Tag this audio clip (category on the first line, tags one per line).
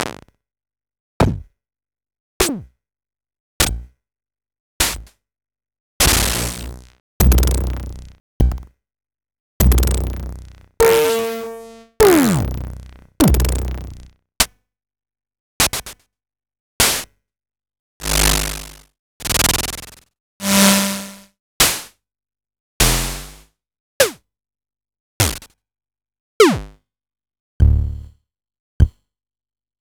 Instrument samples > Synths / Electronic
blastbeats
chain